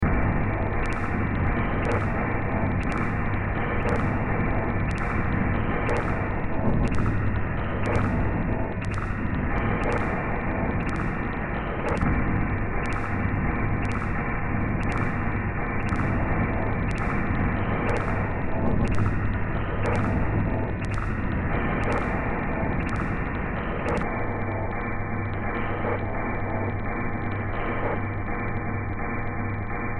Music > Multiple instruments
Demo Track #3649 (Industraumatic)
Ambient, Cyberpunk, Games, Horror, Noise, Sci-fi